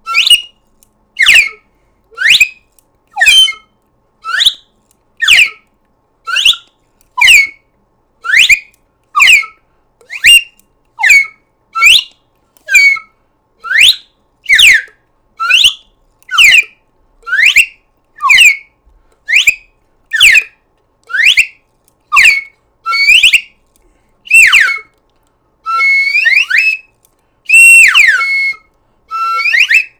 Sound effects > Objects / House appliances
TOONWhis-Blue Snowball Microphone, CU Slide, Spedup Nicholas Judy TDC
Spedup slide whistles.